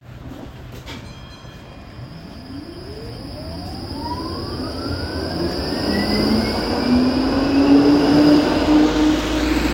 Sound effects > Vehicles
The sound of a tram passing. Recorded in Tampere on iPhone 13 with the Voice Memos app. The purpose of recording was to gather data from vehicles passing by for a binary sound classifier.
tram, Tampere, field-recording